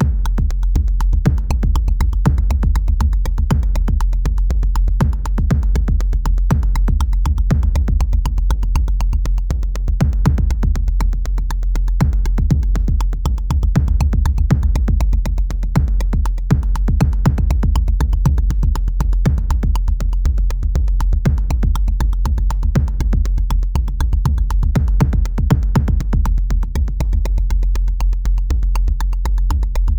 Music > Multiple instruments
Drum loop done with Digitak 2 and factory sample I used a lot sample rate reduction to obtain this kind of sound - I like it :)